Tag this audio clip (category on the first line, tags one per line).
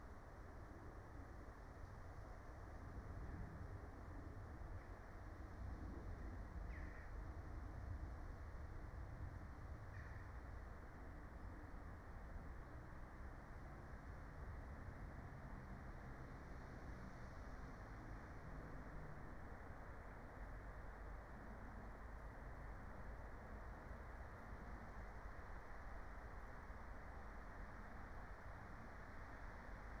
Soundscapes > Nature
meadow
raspberry-pi
soundscape
nature
phenological-recording